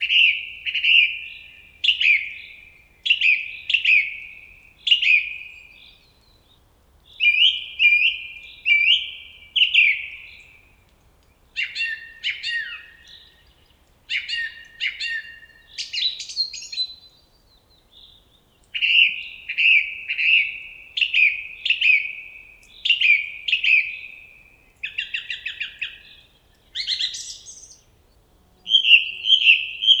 Sound effects > Animals
Beautiful birdsong 4
Beautiful bird song recording recorded in a Polish forest. Sounds of birds singing in their natural habitat. No human or mechanical sounds. Effects recorded from the field.
forest, background, calm, outdoor, environmental, ambience, European-forest, peaceful, birdsong, birds, rural, wild, soundscape, nature, atmosphere, natural, Poland, field-recording, singing